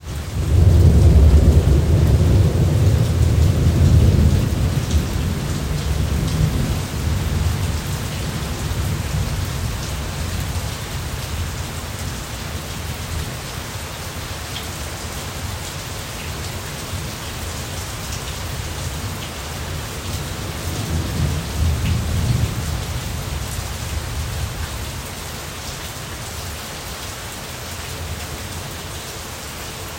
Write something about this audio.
Sound effects > Natural elements and explosions
Rainstorm, Lightning & Thunder 2
Heavy rainstorm with occasional lightning bolts and thunder. Recorded in Tulsa, OK on the evening of September 20, 2025.
bolt, lightning, lightning-bolt, rain, rainstorm, storm, thunder, thunderstorm, weather